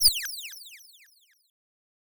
Sound effects > Electronic / Design
SHARP BRIGHT CIRCUIT CHIP
BEEP, CHIPPY, UNIQUE, HARSH, SHARP, ELECTRONIC, EXPERIMENTAL, HIT, INNOVATIVE, SYNTHETIC, CIRCUIT, COMPUTER, DING, BOOP, OBSCURE